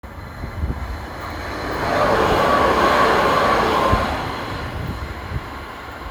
Soundscapes > Urban
The sound of a passing tram recorded on a phone in Tampere
field-recording, Tram, railway